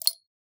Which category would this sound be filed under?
Sound effects > Objects / House appliances